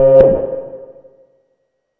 Instrument samples > Synths / Electronic
Benjolon 1 shot16
1SHOT, BENJOLIN, CHIRP, DIY, DRUM, ELECTRONICS, NOISE, SYNTH